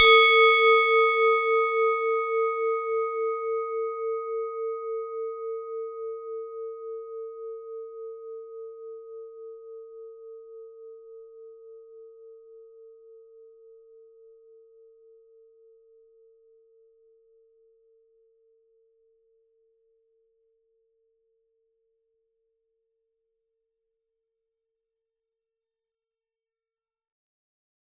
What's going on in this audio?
Instrument samples > Percussion
Swiss cowbell 14Wx11Hcm - Under mic
Subject : A cowbell (actual bell not the instrument) 14cm large by 11cm high. Recorded with the microphone under the bell pointing up towards the rim. Date YMD : 2025 04 21 Location : Gergueil France. Hardware : Tascam FR-AV2 Rode NT5 microphones. Weather : Processing : Trimmed and Normalized in Audacity. Probably some Fade in/outs too.
close-up, one-shot, bell